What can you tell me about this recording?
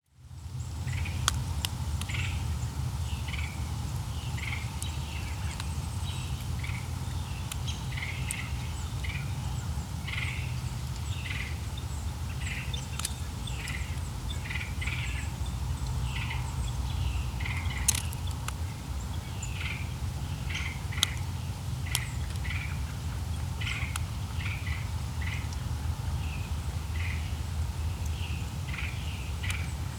Soundscapes > Nature
Icicle Drips and Morning Birds
A recording of icicles dripping onto leaves, with a background of morning birds. Captured in a city park in Nashville TN. Equipment- Pair omni Clippy EM272 mics. Zoom F3 field recorder.
field-recording, ambient, background-sound